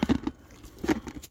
Sound effects > Animals
FOODEat-Samsung Galaxy Smartphone, CU Donkey, Munching Nicholas Judy TDC
A donkey munching. Recorded at Hanover Pines Christmas Tree Farm.